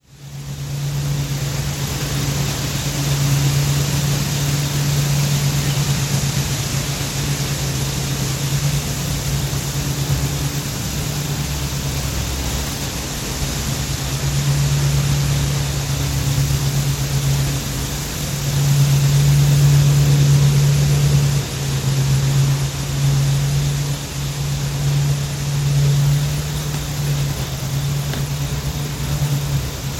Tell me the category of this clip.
Soundscapes > Nature